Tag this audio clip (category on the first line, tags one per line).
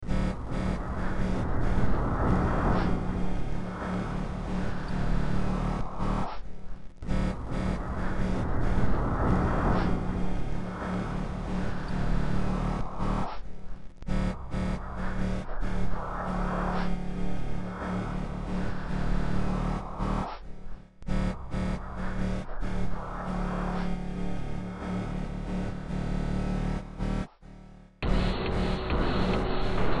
Music > Multiple instruments
Ambient
Industrial
Games
Underground
Noise
Horror
Soundtrack
Sci-fi
Cyberpunk